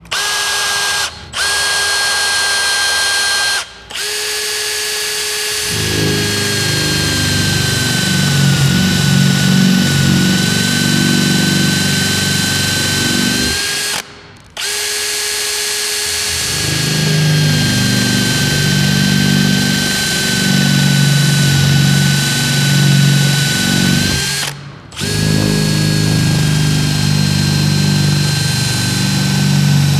Sound effects > Other mechanisms, engines, machines
Carpenter using a drill while working -002
Carpenter using a drill while working ,is perfect for cinematic uses,video games.Effects recorded from the field.
creating, workshop, tools, workerhome, fixing, labor, tradeconstruction, improvement, professional, woodworking, craftsman, drillingwood, construction, skilled, buildingcarpentry, drill, power, manual, carpenter